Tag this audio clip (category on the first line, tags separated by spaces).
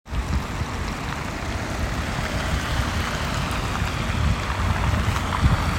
Sound effects > Vehicles
automobile car outside vehicle